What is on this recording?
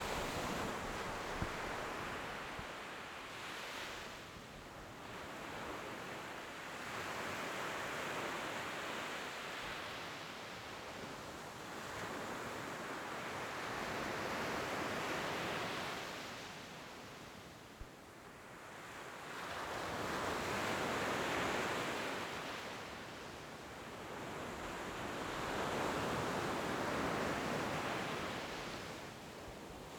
Soundscapes > Nature
Calella beach, waves